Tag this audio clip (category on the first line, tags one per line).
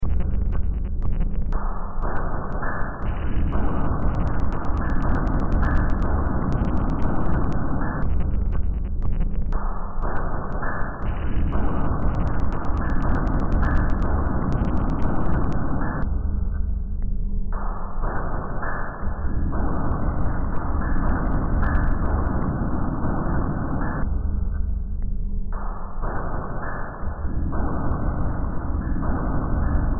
Music > Multiple instruments
Games
Cyberpunk
Ambient
Noise
Industrial
Underground
Sci-fi
Soundtrack
Horror